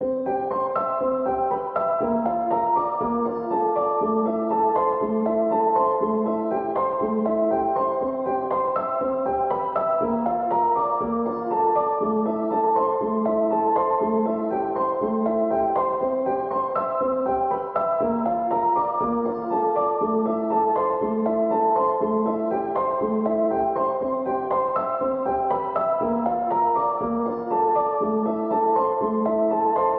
Music > Solo instrument
Piano loops 196 efect 4 octave long loop 120 bpm
music, 120bpm, loop, simple, 120, pianomusic, free, samples, reverb, piano, simplesamples